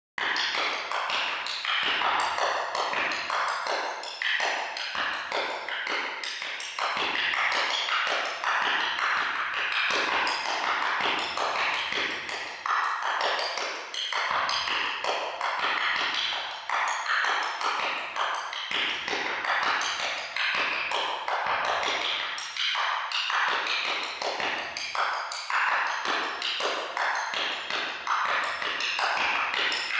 Music > Solo percussion
Perc Loop-Huge Reverb Percussions Loop 7
All samples used from phaseplant factory. Processed with Khs Filter Table, Khs convolver, ZL EQ, Fruity Limiter. (Celebrate with me! I bought Khs Filter Table and Khs convolver finally!)
Ambient, Cinematic, reverb, Loop, Percussion, Cave, Underground